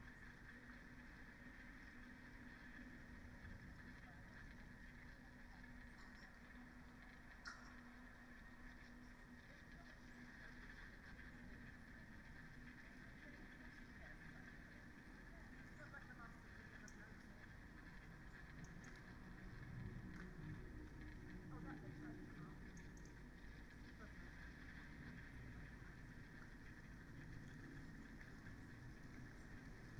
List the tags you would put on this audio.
Soundscapes > Nature
weather-data
nature
field-recording
modified-soundscape
natural-soundscape
data-to-sound
sound-installation
raspberry-pi
soundscape
phenological-recording
alice-holt-forest
Dendrophone
artistic-intervention

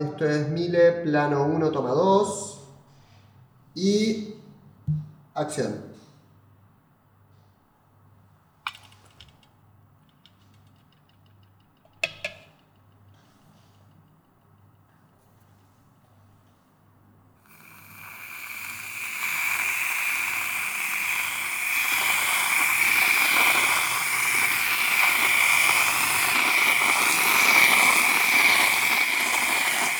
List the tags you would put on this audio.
Sound effects > Objects / House appliances
Cream
Dessert
Eating
Gastronomy
Strawberries
Whipped

